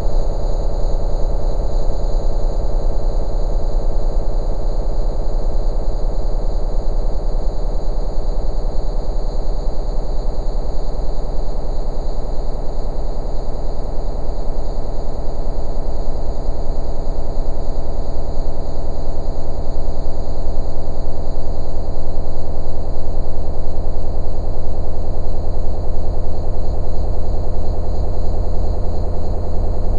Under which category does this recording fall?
Sound effects > Experimental